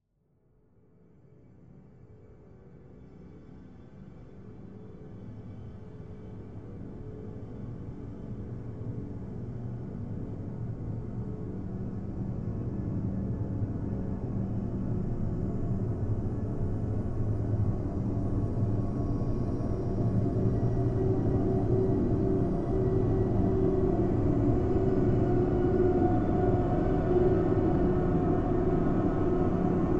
Soundscapes > Synthetic / Artificial
soft dark odd backtrack